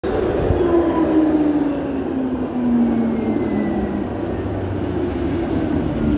Sound effects > Vehicles
04.Tram tostop hervanta28.11
A tram is nearing a tram station, slowing down in order to stop. You can hear the slowing down.
stop, field-recording